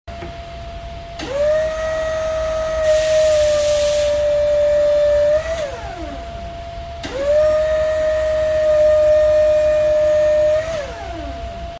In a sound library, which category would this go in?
Sound effects > Other mechanisms, engines, machines